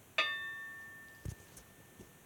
Sound effects > Other
Hitting a metal pipe softly. Recorded with my phone.
hit, metal, ping, ting